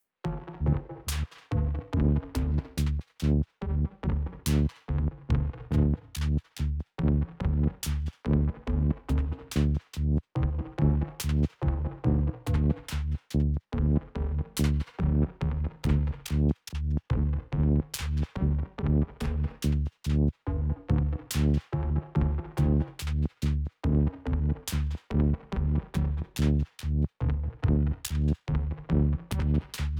Music > Multiple instruments
clockwise works- BUMP 8
1-shot-improvisation with digital rhythm (Casio PT-31 synth+Zoom 9030 multieffect) and synthbass (Roland Juno-106) played live with no quantization..recorded and mixed with Ableton 11
beat, cleaner, drum-loop, garbage, percs, percussion-loop, rhythm